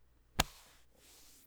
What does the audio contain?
Sound effects > Human sounds and actions
bump into someone sfx
The sound of a body hitting another body, as if you've just walked into someone, followed by brief clothing sounds. I needed this sound for a project so I recorded it by thumping my own shoulder. Recorded via Blue Yeti mic, lightly edited with Audacity.